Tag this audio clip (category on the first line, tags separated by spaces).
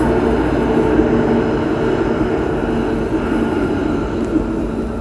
Sound effects > Vehicles
vehicle transportation tramway